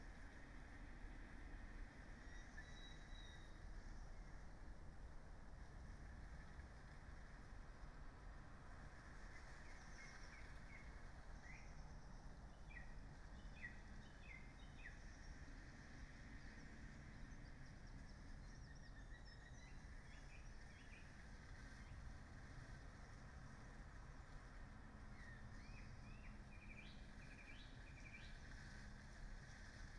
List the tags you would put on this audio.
Soundscapes > Nature
nature alice-holt-forest field-recording Dendrophone natural-soundscape soundscape weather-data modified-soundscape sound-installation raspberry-pi phenological-recording data-to-sound artistic-intervention

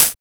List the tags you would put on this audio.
Instrument samples > Percussion
8-bit FX game percussion

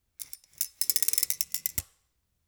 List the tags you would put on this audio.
Sound effects > Objects / House appliances
Single-mic-mono Hypercardioid 7000 FR-AV2 Shotgun-microphone vacuum-cleaner MKE-600 Shotgun-mic aspirateur vacuum cleaner Powerpro-7000-series Vacum MKE600 Sennheiser Powerpro Tascam

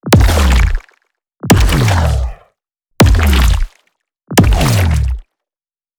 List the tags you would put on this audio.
Sound effects > Electronic / Design
bass bassy boom bright cinematic deep huge impact kick low lowpitch punch punchy sfx thud thumb trailer transient transition

